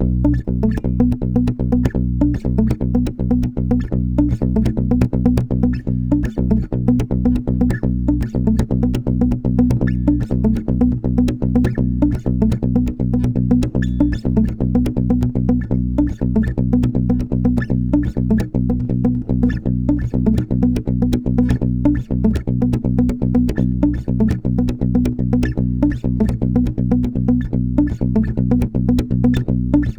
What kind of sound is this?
Music > Solo instrument
guitar mute pluck riff 122bpm
a muted plucked guitar riff at 122bpm that repeats for a bit
pluck, mellow, indie, acoustic, jazz, clean, mute, electric-guitar, riff, rock, loop, music, notes, jazzy, groovy, loopable, rhythm, musica, plucked, guitarloop, muted, 122bpm, chord, guitar, melody, funk, chill, funky